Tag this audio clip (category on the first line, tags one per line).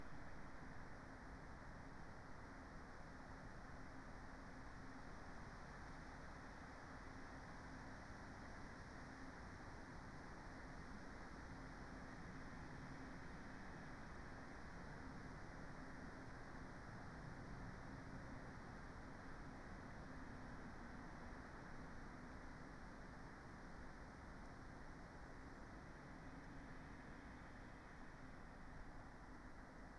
Soundscapes > Nature
natural-soundscape
sound-installation
field-recording
soundscape
nature
raspberry-pi
Dendrophone
artistic-intervention
data-to-sound
alice-holt-forest
phenological-recording
weather-data
modified-soundscape